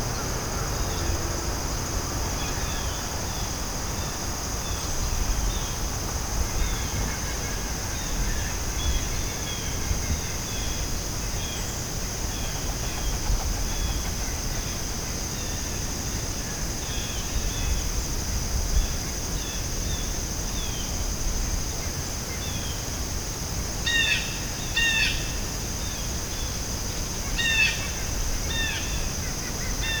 Soundscapes > Nature
AMBRurl-Summer Dirt Road bordering lake, quiet, insects, birdson QCF Washington NH Zoom H1n
Early morning in early summer on a dirt road bordering a quiet New Hampshire Lake.